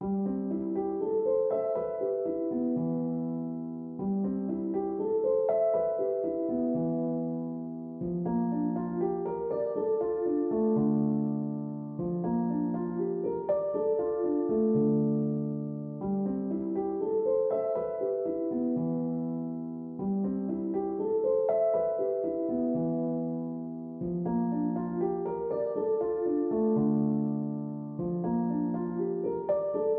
Music > Solo instrument
Piano loops 195 octave down long loop 120 bpm
reverb, music, simple, free, loop, pianomusic, 120bpm, simplesamples, piano, samples, 120